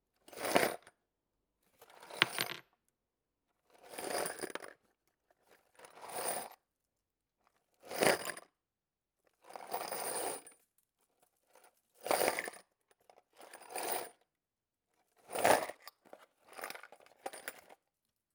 Sound effects > Objects / House appliances
Moving a nail box Recorded with Zoom H2n , edited with RX